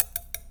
Sound effects > Objects / House appliances
Metal Tink Oneshots Knife Utensil 15
Vibrate, ding, Foley, Klang, Metal, ting, FX, Perc, Wobble, Vibration, SFX, Clang, Trippy, Beam, metallic